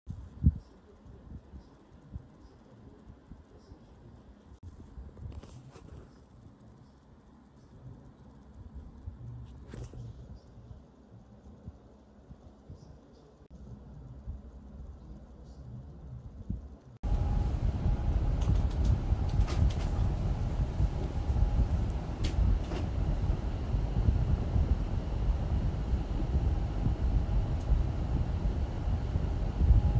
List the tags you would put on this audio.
Soundscapes > Indoors
air-conditioning fan refrigerator vent

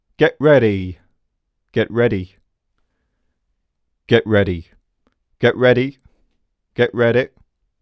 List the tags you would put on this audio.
Speech > Solo speech
multi-take Mid-20s hype voice chant Man Vocal Tascam dry un-edited get Male ready Neumann U67 FR-AV2 raw to-be-edited

